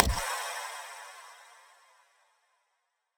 Sound effects > Experimental
snap crack perc fx with verb -glitchid 007
abstract, alien, clap, crack, edm, experimental, fx, glitch, glitchy, hiphop, idm, impact, impacts, laser, lazer, otherworldy, perc, percussion, pop, sfx, snap, whizz, zap